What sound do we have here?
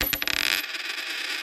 Sound effects > Objects / House appliances
OBJCoin-Samsung Galaxy Smartphone Dime, Drop, Spin 04 Nicholas Judy TDC
drop, dime, spin, foley, Phone-recording